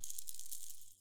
Instrument samples > Percussion
Dual shaker-004
sampling, recording, percusive